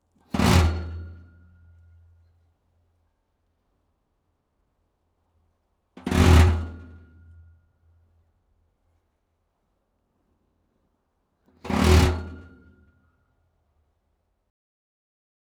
Sound effects > Other mechanisms, engines, machines
METLTonl Hot Water Strum Long
Long Strums on the grill of an outdoor domestic hot water service with my fingers.